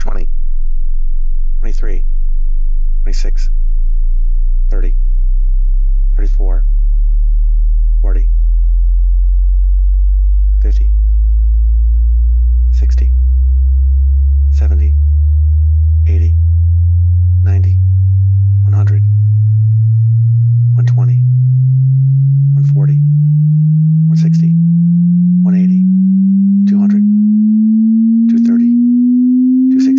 Sound effects > Electronic / Design
Frequency Sweep 20Hz-20KHz with voice markers, taking 80 seconds
A logarithmic frequency sweep from 20Hz to 20KHz, taking 80 seconds, with my voice calling out certain key frequencies. The sweep was created using Sound Forge 11. Along with a meter or spectrum analyzer, or just my ears, I find this useful for quick calibration of audio systems. Taking twice as long makes it easier to see smaller peaks and dips in the response.
calibrate, spectrum, sweep, frequency